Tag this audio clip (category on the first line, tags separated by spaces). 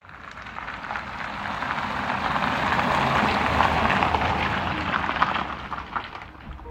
Sound effects > Vehicles
driving vehicle electric